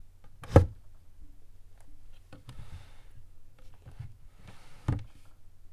Sound effects > Objects / House appliances
Wooden Drawer 09
wooden, drawer, open